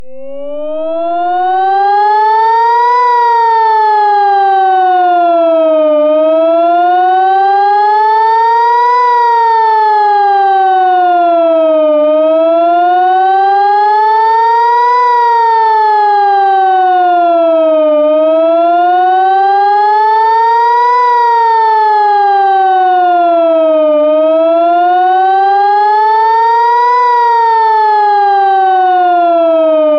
Sound effects > Other
Hormann ECN sirens Israel attack synth
A recreation of Israeli sirens using synth
raid, air, war